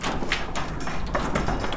Sound effects > Objects / House appliances
bay door jostle metal-002
oneshot, fx, natural, drill, glass, hit, stab, metal, fieldrecording, percussion, foundobject, industrial, mechanical, sfx, bonk, foley, perc, clunk, object